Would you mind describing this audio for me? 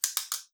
Instrument samples > Percussion
Cellotape Percussion One Shot12
adhesive, ambient, cellotape, cinematic, creative, design, DIY, drum, electronic, experimental, foley, found, glitch, IDM, layering, lo-fi, one, organic, pack, percussion, sample, samples, shot, shots, sound, sounds, tape, texture, unique